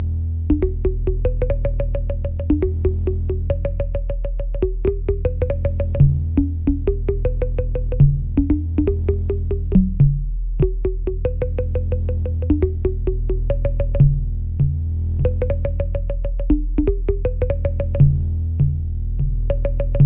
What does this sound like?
Instrument samples > Percussion
Bongo Blips with low-frequency drum loop 120-bpm
loop, 120-bpm, bongo, blip, percussion-loop, drum, percussion